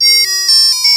Sound effects > Electronic / Design
GAMEArcd-Samsung Galaxy Smartphone, CU 8 Bit Electronic Arcade Melody, You Lose Nicholas Judy TDC
8-bit electronic arcade melody - you lose.
game-over, melody, 8-bit, arcade, you-lose, Phone-recording, electronic, try-again